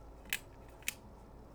Sound effects > Objects / House appliances
OBJFash-Blue Snowball Microphone, MCU Sunglasses, Frames Closed Nicholas Judy TDC
Sunglasses flames closed.
Blue-Snowball, close, frame, sunglasses